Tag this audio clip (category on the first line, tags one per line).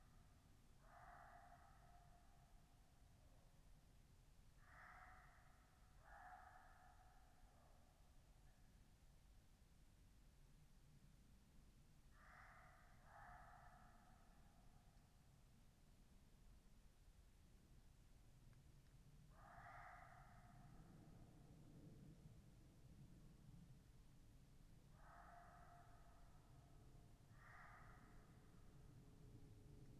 Soundscapes > Nature
data-to-sound
natural-soundscape
phenological-recording
modified-soundscape
field-recording